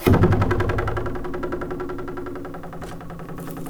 Sound effects > Other mechanisms, engines, machines
Handsaw Beam Plank Vibration Metal Foley 1
vibration, metallic, shop, twangy, fx, plank, metal, twang, foley, saw, vibe, perc, sfx, household, smack, handsaw, percussion, hit, tool